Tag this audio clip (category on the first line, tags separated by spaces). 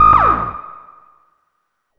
Instrument samples > Synths / Electronic
HARSH MODULAR NOISE SYNTH